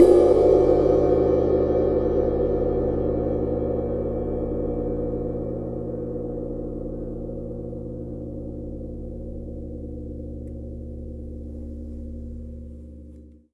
Music > Solo instrument
Paiste 22 Inch Custom Ride-005
Percussion, Custom, Ride, Oneshot, Drum, Paiste, Perc, Cymbals, Cymbal, Kit, 22inch, Metal, Drums